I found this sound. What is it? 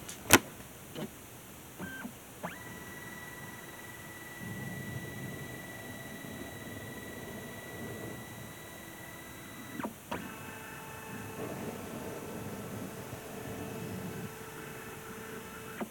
Sound effects > Objects / House appliances
Photocopier having its lid closed, then it scans a sheet of paper, then moves scan head back to resting position. Recorded with my phone. Excuse the poor quality as the thing is really quiet and i don't have a way to record it loudly and without noise.
appliance, motor, electronic, scanner, electric, whine, machine, photocopier